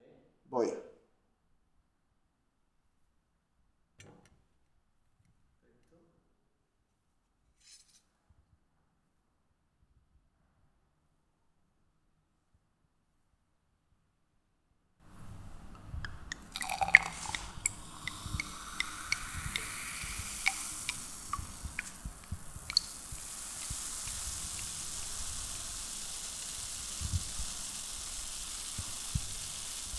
Sound effects > Objects / House appliances
Serving Champagne on Glass 1
Serving a full bottle of champagne in a glass. Can clearly make out the initial "gloob gloob" and afterwards the "fizz" of the bubbles.
Fizz, Serving